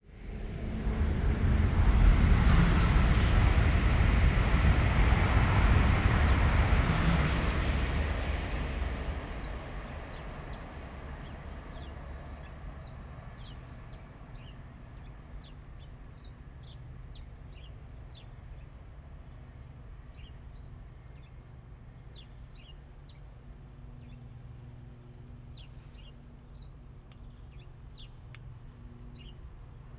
Soundscapes > Nature
A recording of a train and car passing by.